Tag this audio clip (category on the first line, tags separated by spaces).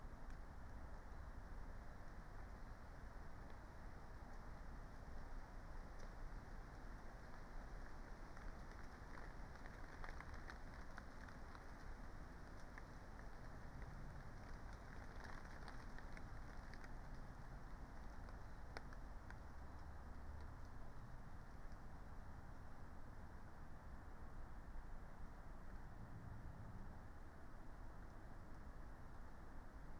Soundscapes > Nature
alice-holt-forest natural-soundscape artistic-intervention field-recording raspberry-pi nature data-to-sound soundscape Dendrophone sound-installation weather-data modified-soundscape phenological-recording